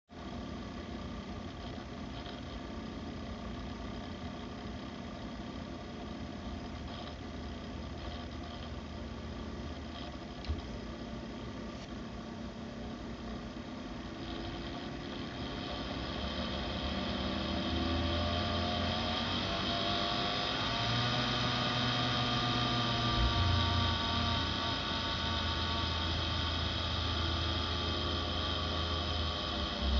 Sound effects > Other mechanisms, engines, machines
Lenovo ThinkPad P15 Loud Fan Noise
Fan noise coming from Lenovo ThinkPad P15 Gen 1. Over time, fans have suddenly become noisy likely (in part) due to the buildup of dust and debris, which can cause the fan to become unbalanced and rattle, which results in this characteristic buzzing sound which is even more noticeable under high load. The fans producing noise in this clip: - GPU Fan: MG75090V1-C192-S9A - CPU Fan: MG75090V1-C195-S9A